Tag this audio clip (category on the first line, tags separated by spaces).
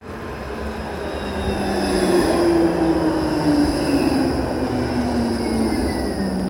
Sound effects > Vehicles
tram tampere rain